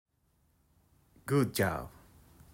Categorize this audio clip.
Speech > Solo speech